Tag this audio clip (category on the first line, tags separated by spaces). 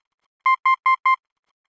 Sound effects > Electronic / Design
Language Telegragh